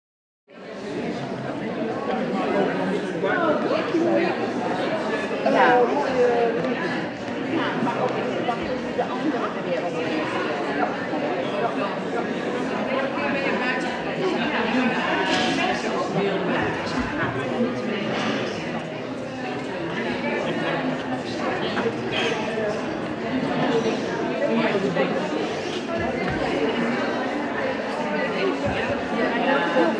Soundscapes > Indoors
Dutch crowd busy internal walla ZIMIHC cafe 11-10-2025. Recorded with iPhone 16 stereo microphones.
Busy walla of Dutch people near a bar/cafe. Some kitchenware sounds.